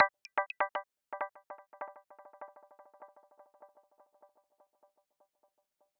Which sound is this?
Sound effects > Electronic / Design
Pluck-Bubule Pluck B Maj Chord
Just a easy botanica pluck that synthed with phaseplant. All plugin used from Khs Ultimate only.